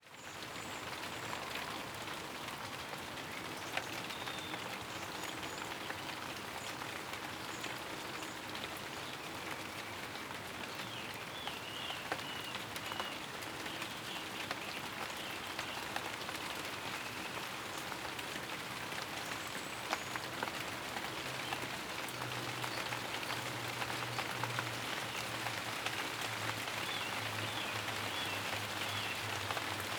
Soundscapes > Nature
Gewitter und Landregen - Steady Rain and Thunder
Once again a new recording of a steady rain with some nice thunder growling in the background. Recorded with a Zoom H2 essential.